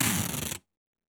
Sound effects > Human sounds and actions
Duck Tape Sound
stretch, tape, ducktape